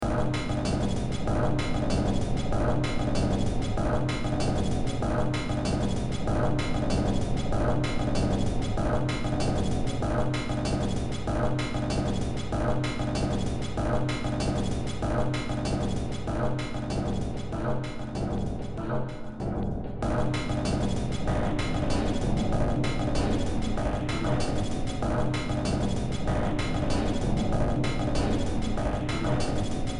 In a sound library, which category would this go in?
Music > Multiple instruments